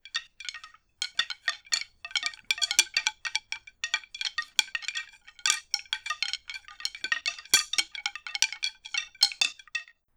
Sound effects > Objects / House appliances
Wood Clanking
This is the sound of a bunch of wooden objects clanking together, as if someone was walking around carrying them. I did this by layering a bunch of recordings of me lightly tapping two drumsticks together, then altered the pitches of the layers. Recorded with a Blue Yeti.